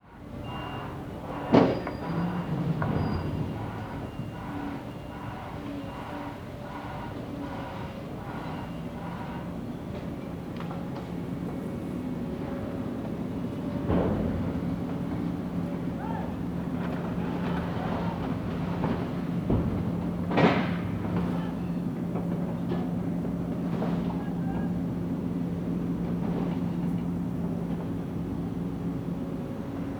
Soundscapes > Urban
Splott - Metal Clanging Distant Shouting Reversing Insects Traffic - Splott Beach Costal Path
Recorded on a Zoom H4N.
fieldrecording, splott, wales